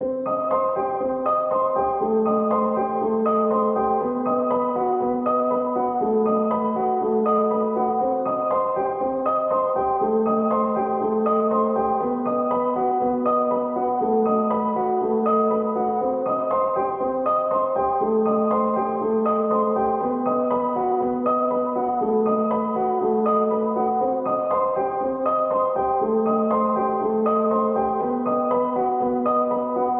Music > Solo instrument
Piano loops 144 efect 4 octave long loop 120 bpm
120bpm, simple, simplesamples, pianomusic, piano, 120, free, music, reverb, loop, samples